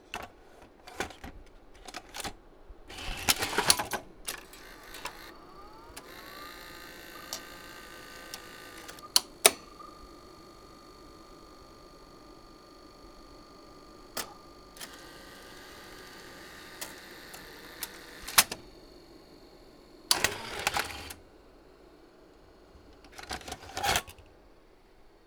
Sound effects > Other mechanisms, engines, machines
U-Matic recorder insert & eject
Sound of a Sony BVU-950P accepting, threading, unthreading and finally ejecting a U-Matic SP video cassette. The sound features inserting the cassette in, the VTR then accepting the cassette and threading it. Shortly after the eject button is pressed, the VTR begins unthreading the tape and ejecting the cassette, then the cassette being taken out of the compartment. Recorded with the Zoom H1n.
broadcast, cassette, eject, insert, lace, machine, machinery, mechanical, motor, player, recorder, sony, SP, tape, thread, threading, U-Matic, unlace, unthread, VCR, vhs, VTR